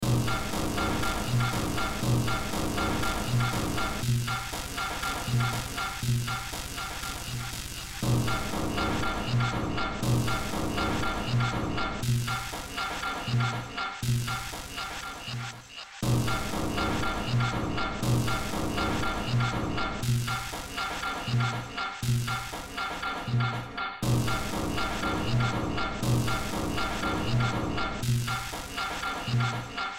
Music > Multiple instruments
Short Track #3139 (Industraumatic)
Horror, Cyberpunk, Sci-fi, Games, Ambient, Industrial, Noise, Soundtrack, Underground